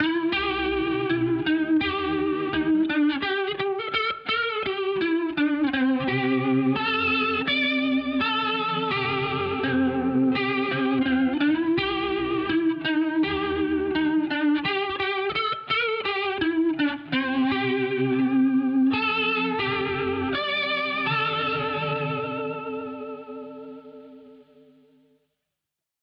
Music > Solo instrument
Psychedelic Guitar - Jazzmaster Fender Mexico - Dreampop
dreamlike, dreamy, shoegaze